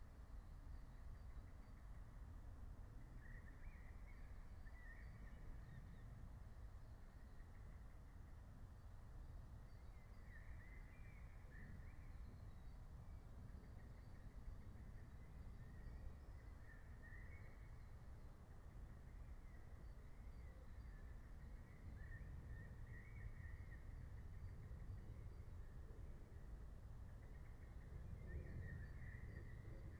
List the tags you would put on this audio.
Soundscapes > Nature
alice-holt-forest field-recording natural-soundscape phenological-recording

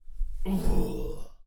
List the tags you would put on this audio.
Sound effects > Human sounds and actions
Creature Growl Grunt Monster

